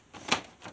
Sound effects > Other mechanisms, engines, machines
A lever being pushed. Recorded with my phone.